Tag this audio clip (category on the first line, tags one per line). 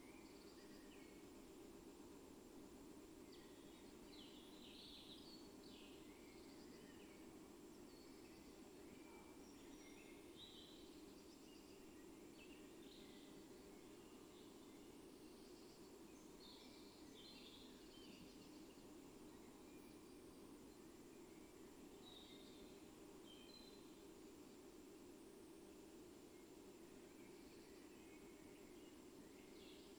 Soundscapes > Nature
nature
weather-data